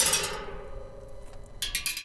Sound effects > Objects / House appliances
Junkyard Foley and FX Percs (Metal, Clanks, Scrapes, Bangs, Scrap, and Machines) 40
Bang, Junk, Junkyard, Metal, Percussion, rattle, scrape, SFX, tube